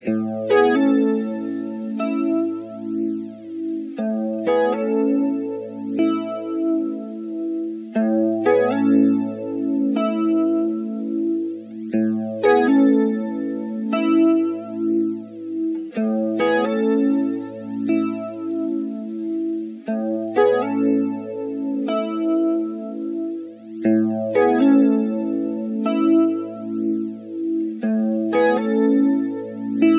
Music > Solo instrument
Guitar loops 126 06 verison 06 60.4 bpm
bpm, reverb, loop, guitar, simplesamples, music, electric